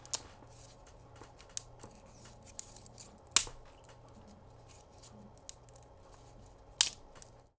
Sound effects > Objects / House appliances
A small-medium twig breaks.